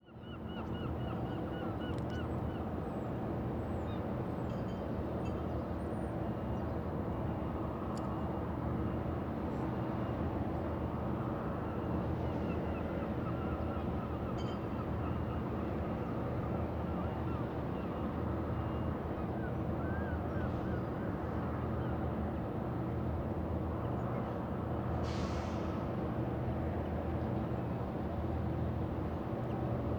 Soundscapes > Urban
St Nazaire-Industrial Bulk Port nice bips faraway
industrie, harbour, Saint-Nazaire, crane, field-recording, port, bulk, boat, work, dock, ambiance, engine, unload, docks, industrial, bip, seagulls, ship